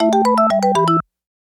Music > Other
Mystery Xylo
Mysterious up and down motif, with a Xylophone lead. Produced on a Korg Wavestate, mastered at -3dBu in Pro Tools.
motif, sound-design, effect, sfx, stinger, ui, bumper, sound-logo, chime, soundeffect, jingle, bump, game, xylophone